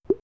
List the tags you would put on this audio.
Soundscapes > Nature
Bubble
Water
Pop
Bubbles

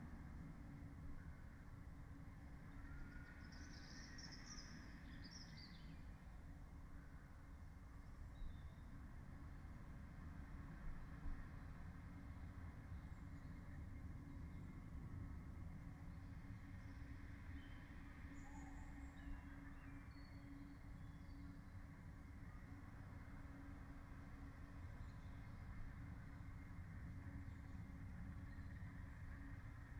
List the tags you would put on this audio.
Soundscapes > Nature
nature
field-recording
raspberry-pi
sound-installation
Dendrophone
artistic-intervention
weather-data
data-to-sound
soundscape
modified-soundscape
natural-soundscape
phenological-recording
alice-holt-forest

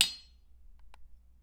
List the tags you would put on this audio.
Sound effects > Objects / House appliances
bonk
clunk
drill
fieldrecording
foley
foundobject
fx
glass
hit
industrial
mechanical
metal
natural
object
oneshot
perc
percussion
sfx
stab

